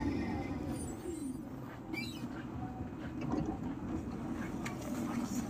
Soundscapes > Urban
final tram 29
finland, hervanta, tram